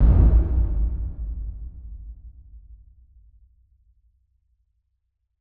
Sound effects > Electronic / Design
POWERFUL RUMBLY HELLISH IMPACT
EXPERIMENTAL, IMPACT, DIFFERENT, RUMBLING, TRAP, HIPHOP, BOOM, UNIQUE, DEEP, RATTLING, EXPLOSION, RAP, HIT, LOW, INNOVATIVE, BASSY